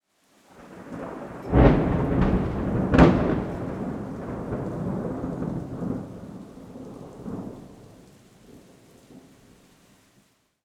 Soundscapes > Nature
Thunderclap, some distant rain can be heard as well.